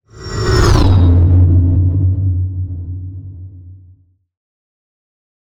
Sound effects > Other
Sound Design Elements Whoosh SFX 005
cinematic, effects, element, elements, fast, fx, motion, sweeping, trailer, transition, whoosh